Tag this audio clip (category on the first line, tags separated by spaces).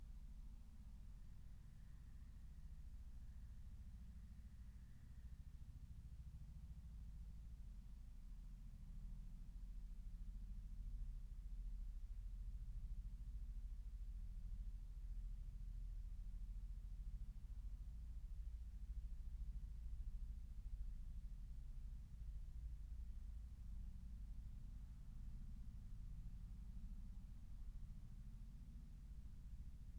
Soundscapes > Nature
meadow phenological-recording nature natural-soundscape raspberry-pi soundscape field-recording alice-holt-forest